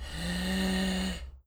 Sound effects > Human sounds and actions
HMNBrth-Blue Snowball Microphone Inhale, Comedy Nicholas Judy TDC
A comedy inhale.
Blue-brand, Blue-Snowball, breath, comedy, human, inhale